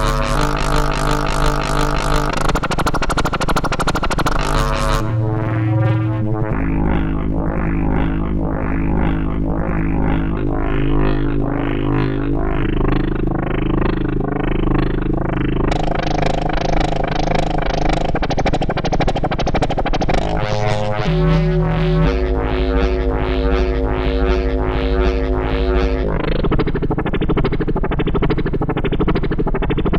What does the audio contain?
Soundscapes > Synthetic / Artificial
Space Drone 008
Drone sound 008 Developed using Digitakt 2 and FM synthesis
artificial, drone, FM, soundscape, space